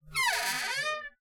Sound effects > Other mechanisms, engines, machines
Squeaky Hinge
squeaky cupboard hinge variations
Creaks, Squeaky, hinge